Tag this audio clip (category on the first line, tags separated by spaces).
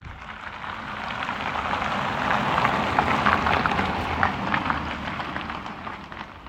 Sound effects > Vehicles
vehicle electric driving